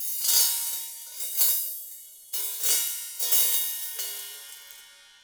Music > Solo instrument
Zildjian Sizzle Chain 16inch Crash-001
Crash, Custom, Cymbal, Cymbals, Drum, Drums, FX, GONG, Hat, Kit, Metal, Oneshot, Paiste, Perc, Percussion, Ride, Sabian